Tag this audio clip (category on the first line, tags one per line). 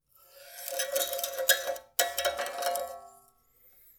Sound effects > Other mechanisms, engines, machines
Foley
Perc